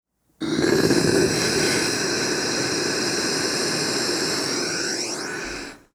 Sound effects > Objects / House appliances
SteamIron Steam Rumbling V01
A sustained mechanical rumble of a steam iron in operation. Ideal for machinery layers, background texture, or ambient beds.
rumble, appliance, machine, industrial, texture, ambience, steam